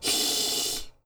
Sound effects > Animals
ANMLCat-Blue Snowball Microphone, CU Hiss Nicholas Judy TDC
A cat hissing.
animal, Blue-brand, Blue-Snowball, cat, hiss